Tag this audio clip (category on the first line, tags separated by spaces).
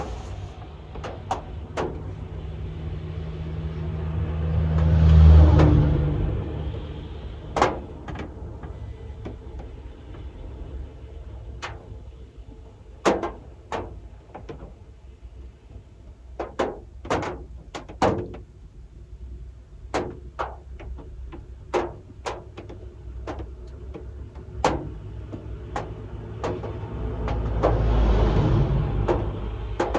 Soundscapes > Urban
belgium car car-interior car-roof cars-passing dripping drips drops falling field-recording iphone-13-mini metal metallic rain raindrops raining rooftop steel traffic water